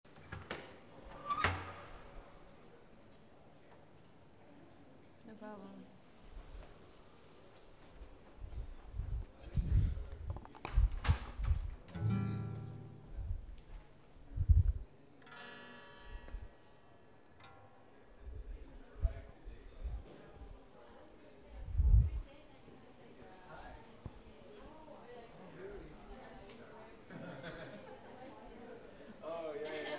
Speech > Conversation / Crowd
the floor sounds
floor, poeple, talking